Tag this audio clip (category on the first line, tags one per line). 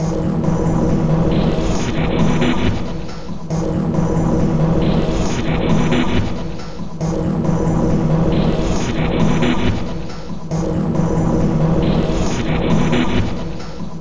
Instrument samples > Percussion
Loop
Samples
Soundtrack
Drum
Loopable
Packs
Dark
Underground
Ambient
Industrial